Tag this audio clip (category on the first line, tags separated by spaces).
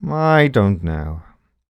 Speech > Solo speech
dialogue Human Man Neumann NPC oneshot Single-take skeptic skepticism talk Tascam U67 unsure Video-game Voice-acting